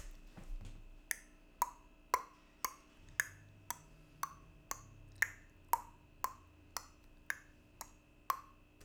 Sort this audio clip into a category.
Music > Other